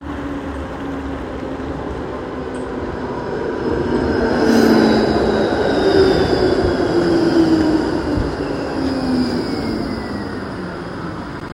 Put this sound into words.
Sound effects > Vehicles
Tram sound
15, city, iPhone, light, mics, Pro, rain, Tram